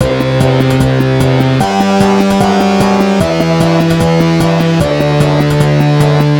Music > Multiple instruments
Generic Waiting Loop
150bpm, generic, loop, original, waiting